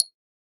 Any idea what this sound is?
Sound effects > Objects / House appliances
Jewellerybox Shake 4 Tone
Shaking a ceramic jewellery container with the contents inside, recorded with an AKG C414 XLII microphone.
jewellery, jewellery-box, metallic, percussion, trinket-box